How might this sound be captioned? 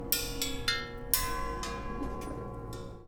Sound effects > Objects / House appliances
Junkyard Foley and FX Percs (Metal, Clanks, Scrapes, Bangs, Scrap, and Machines) 69
Robotic, Percussion, waste, dumpster, Junk, tube, SFX, rattle, Atmosphere, rubbish, scrape, Environment, dumping, Clang, Clank, Bash, Foley, trash, Junkyard, Machine, Perc, Dump, garbage, Metallic, Metal, Robot, Bang, Smash, FX, Ambience